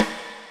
Music > Solo percussion
Snare Processed - Oneshot 108 - 14 by 6.5 inch Brass Ludwig
acoustic, beat, brass, crack, drum, drumkit, drums, flam, fx, hit, hits, kit, ludwig, oneshot, perc, percussion, processed, realdrum, realdrums, reverb, rim, rimshot, rimshots, roll, sfx, snare, snaredrum, snareroll, snares